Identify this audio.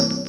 Instrument samples > Synths / Electronic
CVLT BASS 35
wobble, sub, clear, bass, wavetable, lowend, subs, bassdrop, synthbass, subwoofer, drops, lfo, synth, stabs, low, subbass